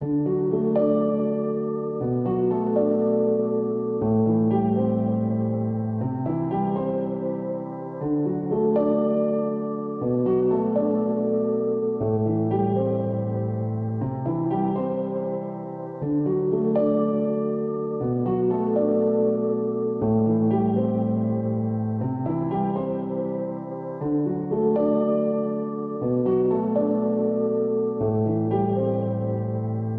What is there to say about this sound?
Music > Solo instrument
Piano loops 040 efect 4 octave long loop 120 bpm
music, 120bpm, piano, samples, 120, reverb, free, loop, pianomusic, simple, simplesamples